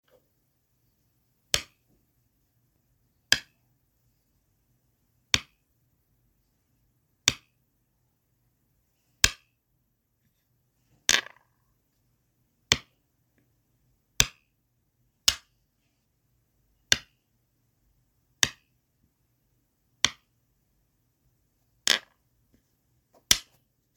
Sound effects > Objects / House appliances
the sound of placing a stone on the Go board (or Baduk/Omok board)
Wuziqi, omok, Fiveinarow, baduk, Gomoku